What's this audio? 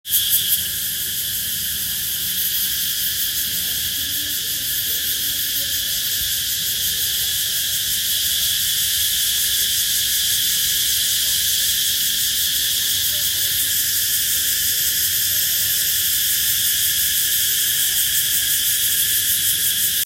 Sound effects > Animals
summer cicada sound